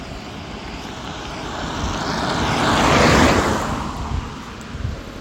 Sound effects > Vehicles
Car driving 6
car, tampere, engine, drive